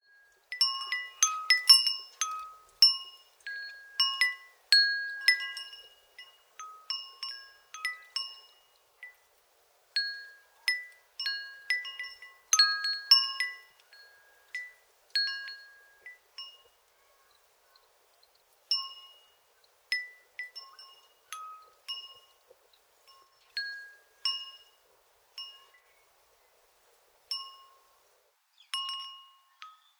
Sound effects > Other mechanisms, engines, machines
4 element tube wind chime attached to a small tree in a light wind in a suburban backyard.
bang, chime, clang, clanging, ding, sfx, wind